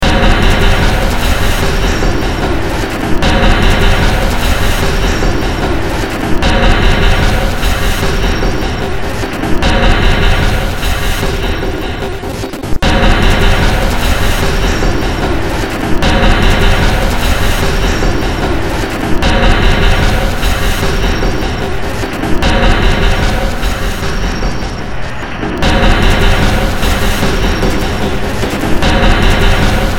Music > Multiple instruments
Short Track #3637 (Industraumatic)
Cyberpunk, Ambient